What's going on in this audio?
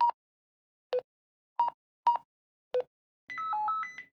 Sound effects > Other mechanisms, engines, machines
Button Beeps
Recording of some buttons of a Handy Walkie-Talkie and lastly a short melodic tone with an access granted vibe. Gear: H4n Sennheiser MKH 50
tone, digital, access, beep, safety, button, lock, vault, click, safe, granted, bleep, code